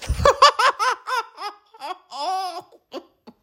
Sound effects > Human sounds and actions
Clown Laugh 03
Evil clown laugh
clown, evil, joker, laugh